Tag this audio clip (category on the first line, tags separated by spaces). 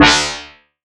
Instrument samples > Synths / Electronic
bass fm-synthesis